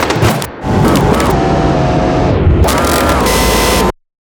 Sound effects > Other mechanisms, engines, machines
Sound Design Elements-Robot mechanism-009
operation, mechanism, movement, hydraulics, elements, motors, actuators, digital, gears, feedback, automation, robotic, powerenergy, servos, design, synthetic, processing, circuitry